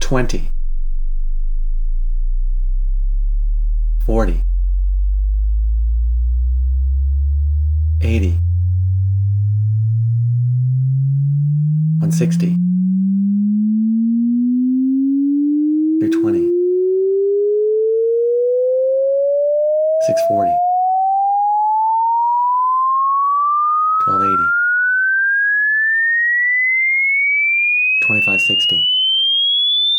Sound effects > Electronic / Design
Frequency Sweep 20Hz-20KHz with voice markers, taking 40 seconds
A logarithmic frequency sweep from 20Hz to 20KHz, taking 40 seconds, with my voice calling out certain key frequencies. The sweep was created using Sound Forge 11. Along with a meter or spectrum analyzer, or just my ears, I find this useful for quick calibration of audio systems.